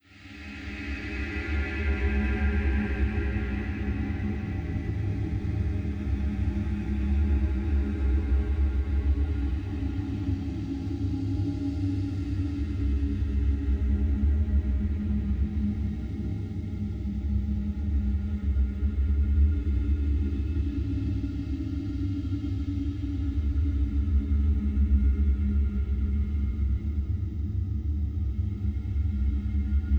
Soundscapes > Other
Light humming dark ambience background
bass, atmosphere, dark, dark-ambience, drone, dungeon, cave, dark-ambient